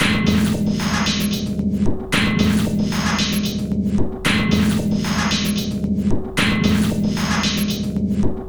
Instrument samples > Percussion
This 226bpm Drum Loop is good for composing Industrial/Electronic/Ambient songs or using as soundtrack to a sci-fi/suspense/horror indie game or short film.
Packs, Underground, Alien, Dark, Drum, Loopable, Samples, Soundtrack, Loop, Ambient, Industrial, Weird